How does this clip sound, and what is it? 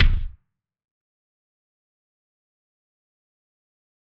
Instrument samples > Percussion
Cooked Kick
Versatile one shot kick sample that cuts through. Dinner is served.
sample, shot, kick, thump